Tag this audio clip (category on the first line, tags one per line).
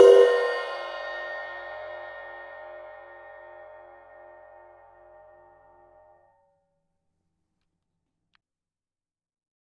Music > Solo instrument
16inch
Crash
Custom
Cymbal
Cymbals
Drum
Drums
Kit
Metal
Oneshot
Perc
Percussion
Zildjian